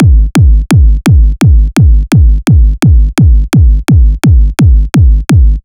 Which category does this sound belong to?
Music > Multiple instruments